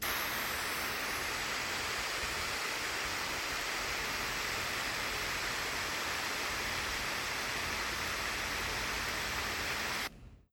Sound effects > Objects / House appliances
WATRSpray-Samsung Galaxy Smartphone, MCU Water Hose, Mist Mode Nicholas Judy TDC
A water hose spraying in mist mode.